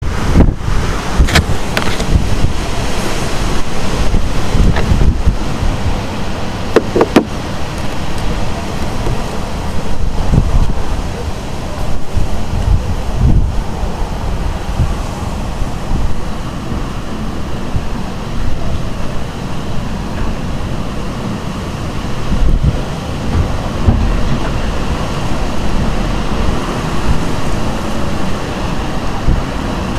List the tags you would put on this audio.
Soundscapes > Other
rain rolling-thunder shower Szczecin thor thunderstorm weather

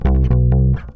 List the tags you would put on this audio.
Instrument samples > String
slide loop charvel mellow pluck plucked loops riffs electric fx rock oneshots bass blues funk

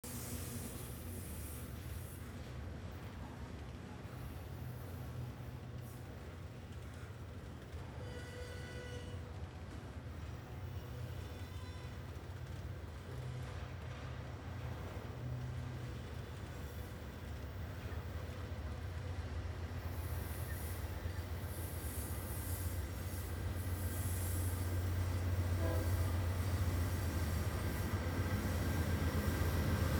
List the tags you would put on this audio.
Sound effects > Other mechanisms, engines, machines
BNSF Burlington-Northern locomotive Rochelle-Railroad-park Santa-fe train

